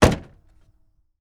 Sound effects > Vehicles
Ford 115 T350 - Right front door closing
Subject : Recording a Ford Transit 115 T350 from 2003, a Diesel model. Date YMD : 2025 August 08 Around 19h30 Location : Albi 81000 Tarn Occitanie France. Weather : Sunny, hot and a bit windy Processing : Trimmed and normalised in Audacity. Notes : Thanks to OMAT for helping me to record and their time.
SM57, Vehicle, Tascam, Single-mic-mono, 115, T350, Ford, Mono, August, A2WS, 2003-model, Ford-Transit, 2025, France, Old, 2003, FR-AV2, Van